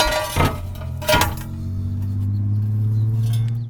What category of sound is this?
Sound effects > Objects / House appliances